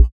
Instrument samples > Synths / Electronic
BUZZBASS 8 Bb
additive-synthesis, bass, fm-synthesis